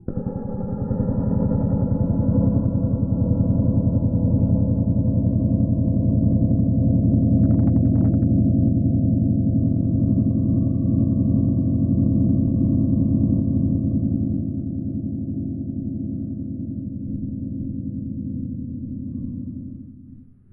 Sound effects > Experimental
Mangling and banging an Aeolian harp in the garden